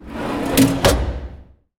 Sound effects > Other mechanisms, engines, machines
Closing the metal drawer 002
A crisp, resonant recording of a metal drawer being closed. Recorded with minimal background noise, the sound is ideal for use in Foley, sound design, ambiance creation, and mechanical transitions.
action, clank, noise, cabinet, pull, shut, storage, sliding, mechanical, close, handle, drawer